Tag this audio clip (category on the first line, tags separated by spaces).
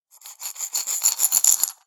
Sound effects > Objects / House appliances
trinket-box jewellery-box jewellery